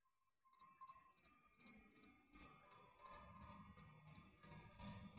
Sound effects > Animals
crickets katydids cicadas insect sound at night
Insects that produce sound at night include crickets, katydids, and cicadas, which use sound primarily to attract mates. I have created this sound by myself and its not AI generated